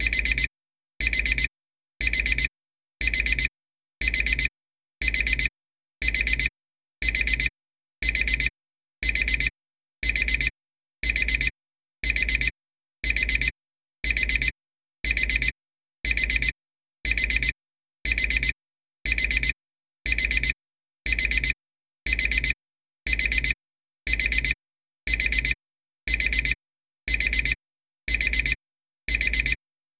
Sound effects > Objects / House appliances
BEEPTimer-Samsung Galaxy Smartphone, CU Alarm Clock Nicholas Judy TDC
A timer or alarm clock beeping.
alarm, beeping, clock, Phone-recording, timer, times-up, wake-up